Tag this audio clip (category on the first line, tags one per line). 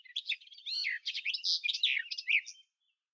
Sound effects > Animals
Garden
nature
UK